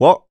Speech > Solo speech
What (vocal)
chant, dry, FR-AV2, hype, Male, Man, Mid-20s, Neumann, oneshot, raw, singletake, Single-take, Tascam, U67, un-edited, Vocal, voice, what